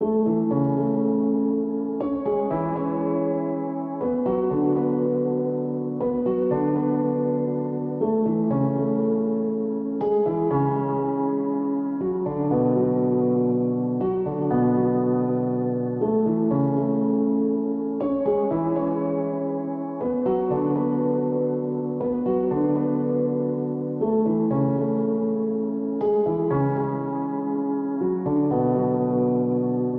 Music > Solo instrument
Piano loops 030 efect 4 octave long loop 120 bpm
simplesamples, 120bpm, piano, free, samples, simple, loop, reverb, music, pianomusic, 120